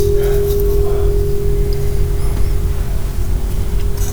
Sound effects > Other mechanisms, engines, machines
Woodshop Foley-022
bam, bang, boom, bop, crackle, foley, fx, knock, little, metal, oneshot, perc, percussion, pop, rustle, sfx, shop, sound, strike, thud, tink, tools, wood